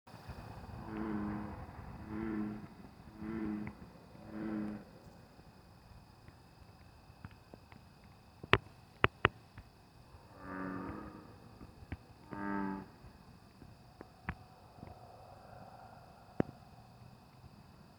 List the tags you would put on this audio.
Sound effects > Animals
americanbullfrog bullfrog croak frog frogs pond river swamp

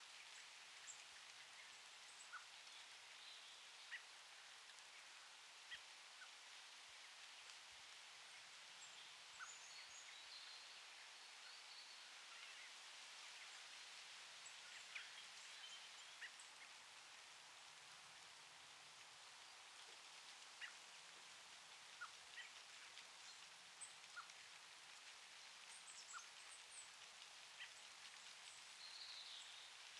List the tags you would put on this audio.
Soundscapes > Nature
ambiance ambience ambient bird birds birdsong chirp field-recording forest leaves morning nature spring trees tweet wind windy

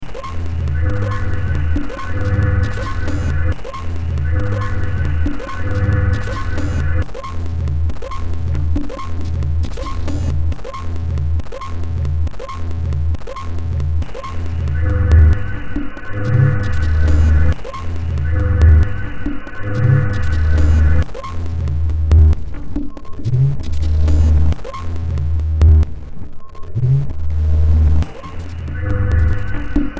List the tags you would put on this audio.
Music > Multiple instruments
Games Sci-fi Cyberpunk Soundtrack Underground Industrial Ambient Noise Horror

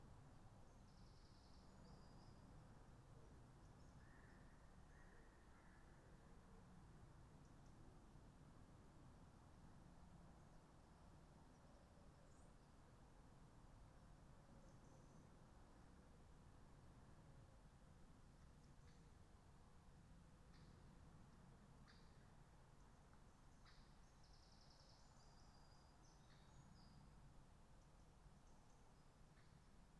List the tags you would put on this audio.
Soundscapes > Nature
sound-installation phenological-recording weather-data artistic-intervention field-recording soundscape natural-soundscape Dendrophone raspberry-pi data-to-sound nature alice-holt-forest modified-soundscape

